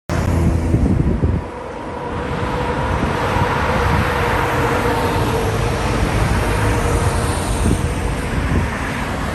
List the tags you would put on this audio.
Sound effects > Vehicles
road
truck
highway

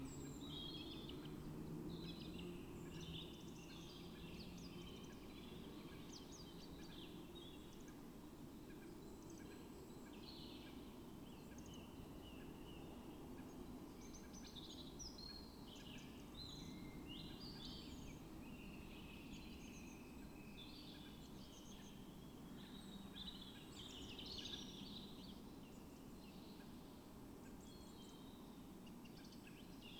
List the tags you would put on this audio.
Soundscapes > Nature
phenological-recording
Dendrophone
modified-soundscape
natural-soundscape
field-recording
sound-installation
alice-holt-forest
artistic-intervention
nature
data-to-sound
weather-data
soundscape

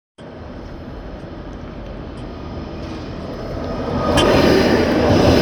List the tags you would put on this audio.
Soundscapes > Urban
recording
Tampere
tram

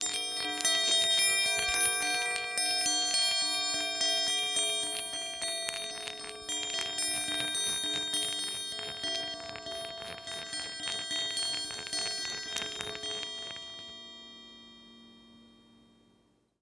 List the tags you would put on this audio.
Instrument samples > Other
balls
baoding
closerecording

